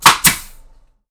Sound effects > Other mechanisms, engines, machines
TOOLPneu-Blue Snowball Microphone, CU Nail Gun, Burst 06 Nicholas Judy TDC
A nail gun burst.
Blue-brand, Blue-Snowball, burst, nail-gun, pneumatic